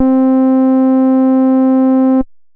Instrument samples > Synths / Electronic
02. FM-X ALL 1 SKIRT 3 C3root
Yamaha, MODX, FM-X, Montage